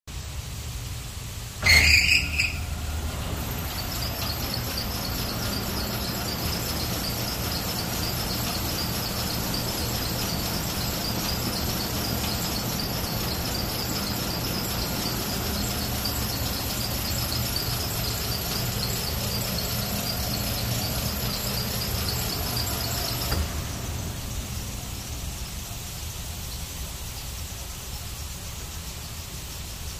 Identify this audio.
Sound effects > Other mechanisms, engines, machines
This sound captures the squeaky belt as the exhaust Fan is turned on and the low-pitch motor of the fan as it is turned off and slows down.
PowerFanOnAndOff, SqueakyBeltOnFan, Machinery, ExhaustFan